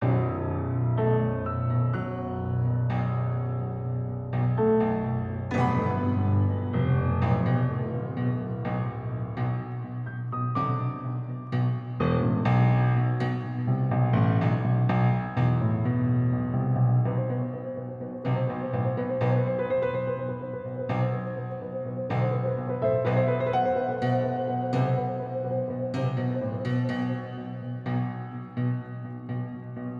Music > Solo instrument
Omminuss Piano Loop 125bpm
an ominous darker slightly washed out piano loop, lots of reverb, evolving movement, hall, theater type vibes.
atmosphere, big, composition, creepy, drama, dramatic, film, hall, haunting, horror, intro, keys, looming, loop, movie, piano, pianoloop, reverb, scary, score, sinister, spooky, suspense, suspenseful, theatrical